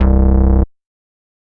Instrument samples > Synths / Electronic
syntbas0005 C-kr
VSTi Elektrostudio (Model Mini+Micromoon)
bass, synth, vst, vsti